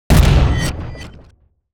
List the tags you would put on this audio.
Sound effects > Other
heavy sound audio power strike design percussive force explosion effects smash crash sfx hard

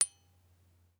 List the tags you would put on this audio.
Sound effects > Other mechanisms, engines, machines
sample blub garage tap

